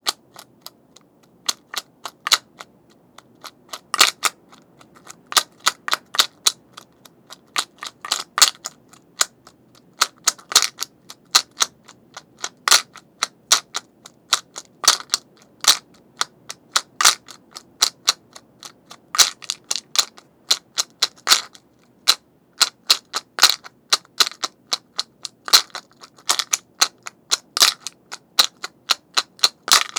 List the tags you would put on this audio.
Instrument samples > Percussion
America Chile crab field percussion Puchuncavi recording shell South stone Valparaiso

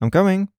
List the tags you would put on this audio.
Speech > Solo speech
2025 Adult Calm FR-AV2 Generic-lines Hypercardioid Im-comming july Male mid-20s MKE-600 MKE600 movement Sennheiser Shotgun-mic Shotgun-microphone Single-mic-mono Tascam VA Voice-acting

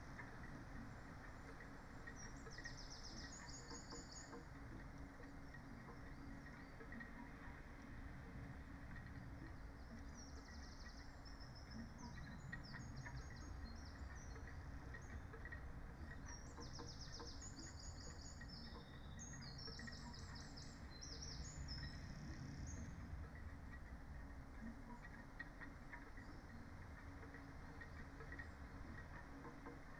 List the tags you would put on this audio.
Soundscapes > Nature
field-recording Dendrophone alice-holt-forest natural-soundscape soundscape nature sound-installation modified-soundscape weather-data data-to-sound phenological-recording artistic-intervention raspberry-pi

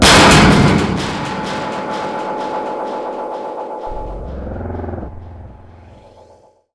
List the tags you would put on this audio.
Music > Solo instrument
chaos industrial techno soundtrack Ableton loop 120bpm

